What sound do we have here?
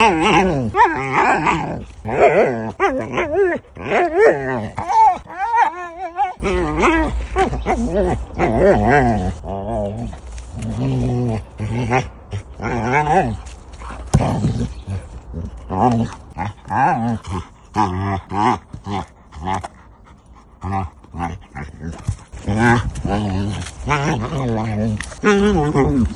Sound effects > Animals
Malinois Dog Sounds
Malinois Belgian Shepherd dog groaning and moaning and making other sounds while picking up chunks of tree trunks. Collage from multiple iPhone 15 Pro videos. Extracted and uploaded with permission using Audacity. The Audacity Labels txt (actually tsv) for the cut points is: 0.000000 0.741723 0.741723 2.049478 2.049478 2.766893 2.766893 4.752766 4.752766 5.227438 5.227438 6.398889 6.398889 9.395465 9.395465 16.328435 16.328435 17.224671 17.224671 18.269592 18.269592 22.325488 22.325488 23.767937 23.767937 25.180635 25.180635 26.158299 Except something doesn't quite work with copying or displaying the tabs.
bark, barking, complaining, fuss, groan, grumbling, moan